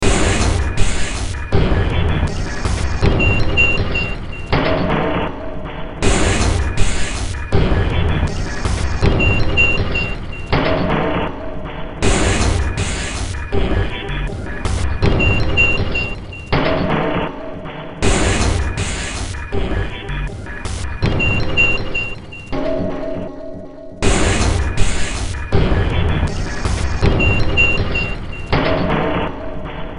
Music > Multiple instruments
Demo Track #3831 (Industraumatic)
Games, Underground, Cyberpunk, Sci-fi, Industrial, Noise, Soundtrack, Ambient